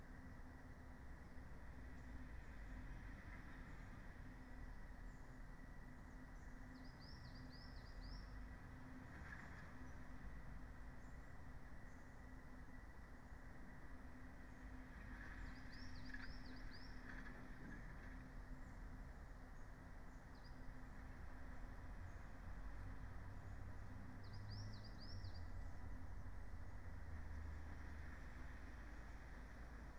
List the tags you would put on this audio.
Soundscapes > Nature
sound-installation
modified-soundscape
field-recording

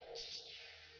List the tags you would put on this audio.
Soundscapes > Synthetic / Artificial
birds
massive
lfo

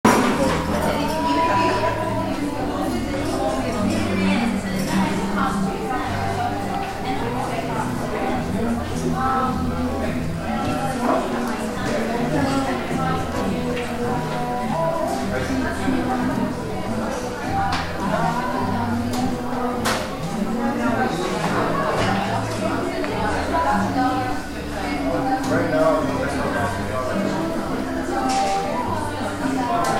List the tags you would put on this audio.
Soundscapes > Indoors
ambience
cafe
talking

